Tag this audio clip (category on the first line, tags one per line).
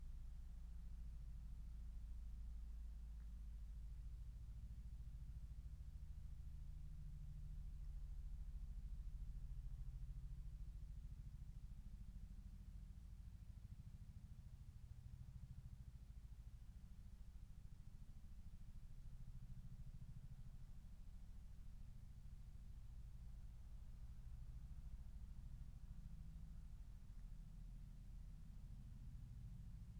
Soundscapes > Nature
data-to-sound
Dendrophone
artistic-intervention
sound-installation
field-recording
alice-holt-forest
weather-data
natural-soundscape
soundscape
modified-soundscape
raspberry-pi
phenological-recording
nature